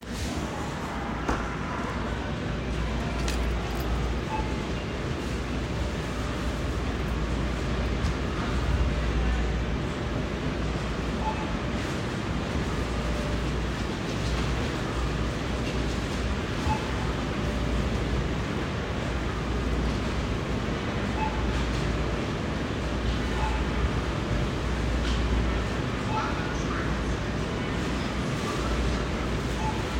Sound effects > Human sounds and actions
Grocery store #1
A grocery store checkout area.